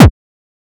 Instrument samples > Percussion
8 bit-Kick6
game, FX, percussion, 8-bit